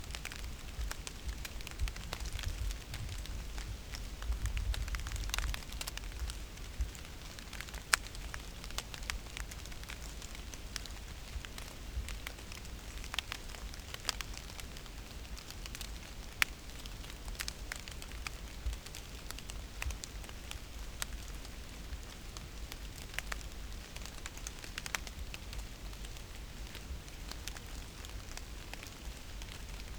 Sound effects > Natural elements and explosions
Rain lightly hitting leaves sounding also like a fire